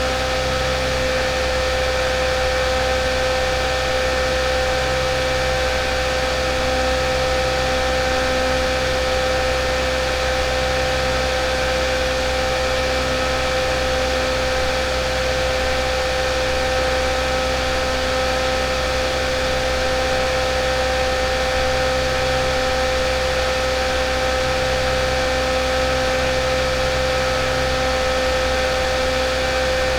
Sound effects > Other mechanisms, engines, machines
Personal battery powered fan - 3 (20cm away) MKE600
Subject : A small personal usb c battery powered fan. 4 Bladed about 5cm blade to blade. Date YMD : 2025 July 23 Early morning. Location : France indoors. Sennheiser MKE600 with stock windcover P48, no filter. Weather : Processing : Trimmed and normalised in Audacity.
FR-AV2, in-proximity, noise, Sennheiser, Shotgun-microphone